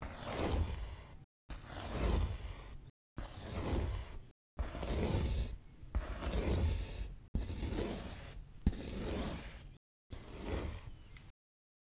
Sound effects > Objects / House appliances
Pencil stroke wide
Pencil scribbles/draws/writes/strokes in wide motions.
draw, pencil, write